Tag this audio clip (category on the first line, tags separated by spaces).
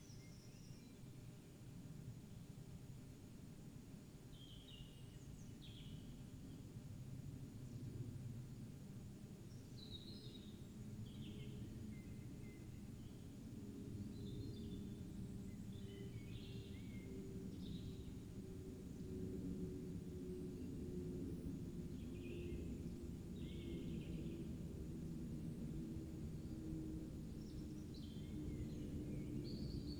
Soundscapes > Nature
natural-soundscape alice-holt-forest sound-installation Dendrophone weather-data soundscape data-to-sound phenological-recording nature artistic-intervention field-recording raspberry-pi modified-soundscape